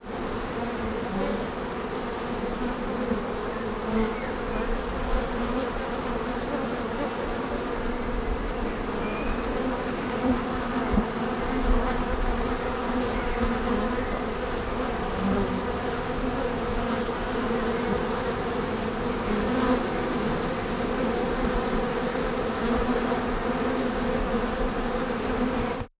Soundscapes > Nature
In the bee box1 - swarm of bees stereo
Very close to the beehive... Only got one stitch :-) "sum, sum, sum" Some birds in the background.